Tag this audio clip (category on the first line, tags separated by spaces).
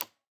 Sound effects > Human sounds and actions
button click interface switch toggle